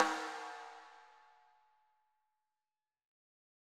Music > Solo percussion
Snare Processed - Oneshot 49 - 14 by 6.5 inch Brass Ludwig
rimshots, rimshot, perc, drum, crack, beat, oneshot, ludwig, drumkit, processed, fx, sfx, percussion, snare, flam, brass, kit, hit, hits, realdrums, snares, roll, drums, acoustic, snareroll, snaredrum, rim, realdrum, reverb